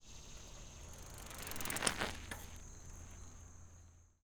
Sound effects > Other mechanisms, engines, machines
Ride by of an old Panasonic (!) 10 speed coasting down a roughly paved road. Recorded by a Tascam X8 in stereo 1 foot off the ground pointing at the pedals; so the bike pans from one side to the other as it passes.